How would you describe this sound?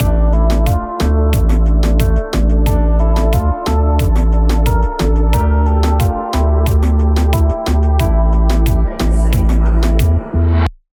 Music > Multiple instruments
cool reggaeton beat. Part of a whole beat. AI generated: (Suno v4) with the following prompt: generate a reggaeton instrumental inspired in the spanish scene (soto asa), at 90 bpm, in D minor.